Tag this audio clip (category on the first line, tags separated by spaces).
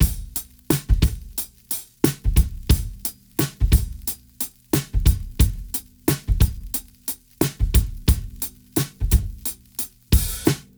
Music > Solo percussion
Dusty DrumLoop 89BPM Drums Drum-Set Acoustic Vinyl Vintage Lo-Fi Drum Break Breakbeat